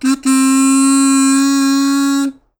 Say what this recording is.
Music > Solo instrument
A kazoo 'ta-da!' accent.